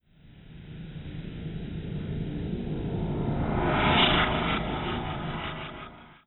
Sound effects > Experimental
reversing strums2
Reversed and mastered sound of garage door springs being impacted. Recorded with my phone, processed with audacity.
creepy, horror